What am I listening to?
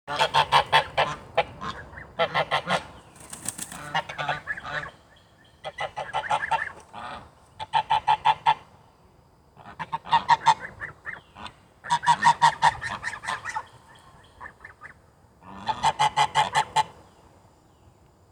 Sound effects > Animals
Waterfowl - Three Geese Honking; Buff Gander, Chinese Goose, and Hybrid Gosling
Three geese that were honking in a pen.
goose, chinese-goose, buff-goose, gosling